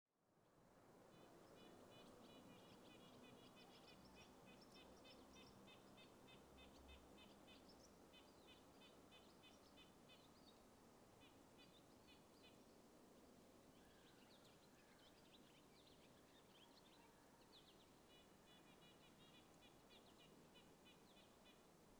Soundscapes > Nature
Red-breasted nuthatch / sittelle à poitrine rousse Tascam DR-60 LOM Uši Pro (pair)